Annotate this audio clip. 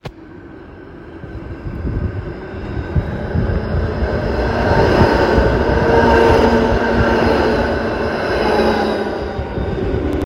Soundscapes > Urban
Tram passing Recording 15
Rail, Trains, Tram